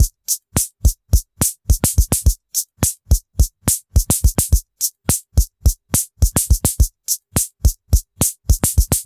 Instrument samples > Percussion
106 Welson Loop 02
106bpm, DrumLoop, DrumMachine, Drums, Electro, Electronic, Hi-Hats, Loop, Rare, Retro, Synth, Vintage